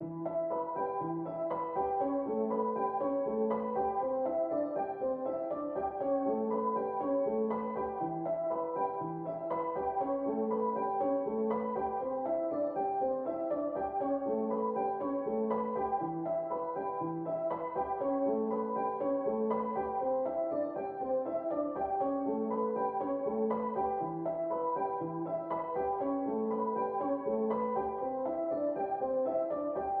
Music > Solo instrument
Piano loops 190 efect octave long loop 120 bpm
pianomusic, simple, loop, reverb, samples, piano, 120bpm, free, 120, simplesamples, music